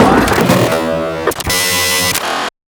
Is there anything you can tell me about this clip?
Sound effects > Other mechanisms, engines, machines
Sound Design Elements-Robot mechanism-003
machine, metallic, clicking, sound, processing, clanking, synthetic, mechanical, servos, motors, robotic, mechanism, gears, automation, hydraulics, circuitry, movement, powerenergy, whirring, design, actuators, grinding, operation, feedback, elements, digital, robot